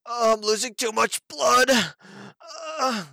Speech > Solo speech
Soldier Blood loss
Losing too much blood